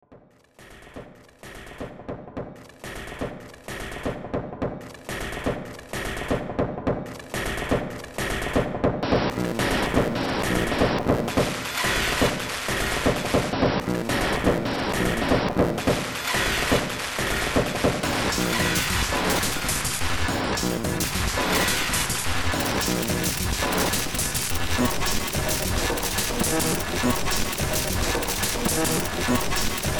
Music > Multiple instruments
Demo Track #3724 (Industraumatic)
Ambient, Cyberpunk, Horror, Industrial, Noise, Sci-fi, Underground